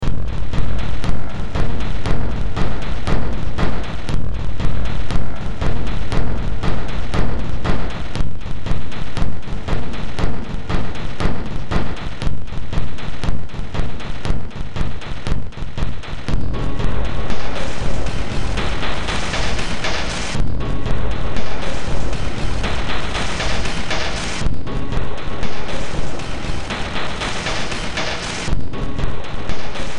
Music > Multiple instruments
Demo Track #3895 (Industraumatic)
Sci-fi, Underground, Ambient, Noise, Cyberpunk, Industrial, Soundtrack, Games, Horror